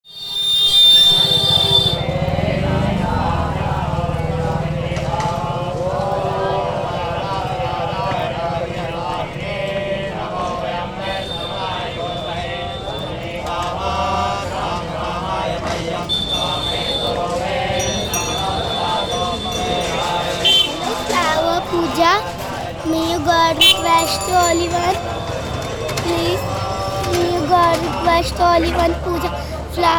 Soundscapes > Other
Loud India (Flower Please) Uttranchal India Rishikesh Swargashram
Sound recorded in India where I explores the loudness produced by human activity, machines and environments in relation with society, religion and traditional culture.